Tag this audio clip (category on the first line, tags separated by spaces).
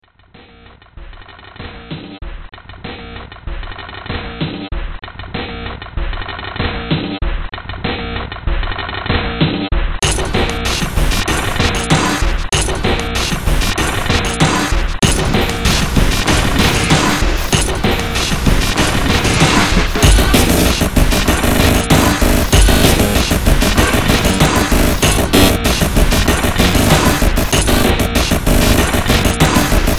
Music > Multiple instruments
Ambient Soundtrack Industrial Sci-fi Noise Cyberpunk Horror Underground Games